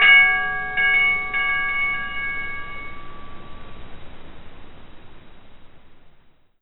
Sound effects > Objects / House appliances
Slowed bit-rotted sample of an old alarm clock running out of spring tension. Recorded with my bad headset mic connected to my PC through Audacity (Not Dolby On like i usually use)